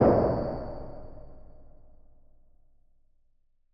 Sound effects > Electronic / Design
BURIED RESONANT CAVE HIT
BASSY, BOOM, DEEP, DIFFERENT, EXPERIMENTAL, EXPLOSION, HIPHOP, HIT, IMPACT, INNOVATIVE, LOW, RAP, RATTLING, RUMBLING, TRAP, UNIQUE